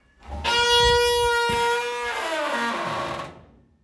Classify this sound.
Sound effects > Human sounds and actions